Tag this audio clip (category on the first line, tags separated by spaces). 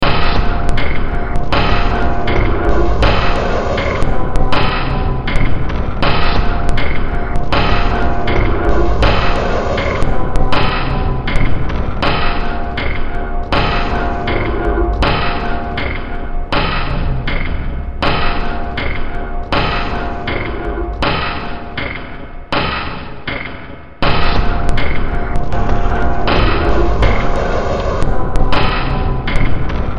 Music > Multiple instruments
Ambient Sci-fi Horror Soundtrack Noise Underground Cyberpunk Games Industrial